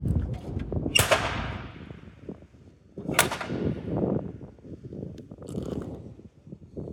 Soundscapes > Urban
Berlin church bells
Church/ cathedral bells ringing in the city centre of Berlin, Germany
bells, brass, berlin, church, germany, morning